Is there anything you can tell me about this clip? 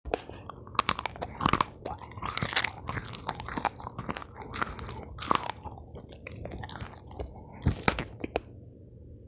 Sound effects > Other
Gross horror eating sounds
The second sampling of me chewing up chicken bone, chicken cartilage, and chicken meat. Got the resources from the Foodlion deli. The first sampling was a total fluke, so I uploaded this one instead. You can use it for, like, a big monster eating something. That's what I'm using it for. Enjoy. ... Definitely rinsing out my mouth after this. Recorded with a standard cellphone microphone. A Samsung Galaxy A02S in particular.
Blood, Gore, Gross, Horror